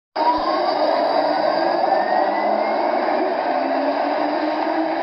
Sound effects > Vehicles
tram accelerating3
Sound of a a tram accelerating from tram stop in Hervanta in December. Captured with the built-in microphone of the OnePlus Nord 4.
field-recording, track, traffic